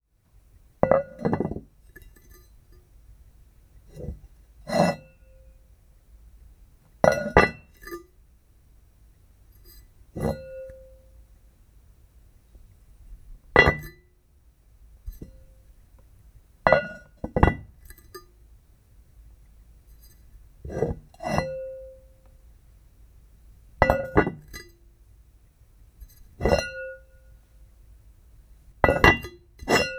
Sound effects > Objects / House appliances
FOODKware Cinematis KitchenAccessories CeramicDesk CeramicTop Put Remove Mid 02 Freebie
Placing and removing a medium ceramic lid on a ceramic surface. This is one of several freebie sounds from my Random Foley | Vol. 4 | Pots & Containers pack.
Sound, Foley, Recording, Zoom, Freebie, Effects, Close, Handling, Mid, Ceramic, PostProduction, Open